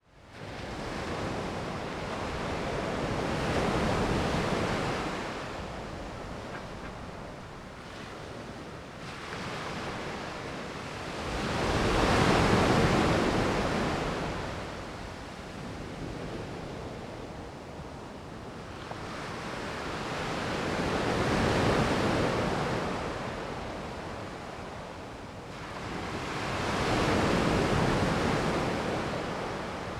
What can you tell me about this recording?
Soundscapes > Nature
250730 061119 PH Ocean waves at White Beach
Ocean waves at White Beach, Puerto Galera. I made this recording in the morning, from the terrace of an hotel located at White Beach, a beautiful sand beach located in the surroundings of Puerto Galera (Oriental Mindoro, Philippines). In the background, one may slightly hear some distant voices. Recorded in July 2025 with a Zoom H5studio (built-in XY microphones). Fade in/out applied in Audacity.